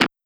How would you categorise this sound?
Sound effects > Human sounds and actions